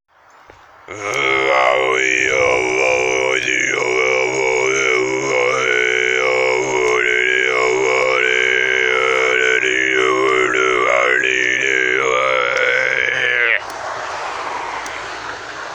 Speech > Solo speech
recorded at mobile phone